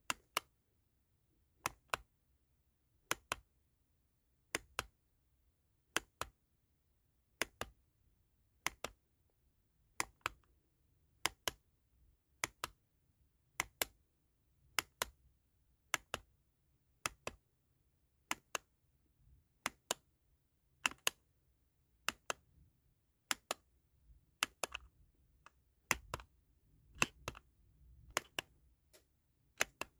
Sound effects > Objects / House appliances
CLOCKMech-Samsung Galaxy Smartphone, CU Plastic Alarm Clock, Button, Clicking Nicholas Judy TDC
A plastic alarm clock button clicking.
alarm, alarm-clock, button, click, clock, Phone-recording, plastic